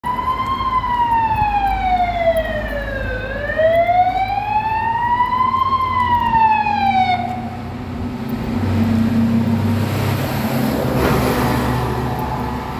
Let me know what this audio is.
Sound effects > Vehicles
12s sound of ambulance siren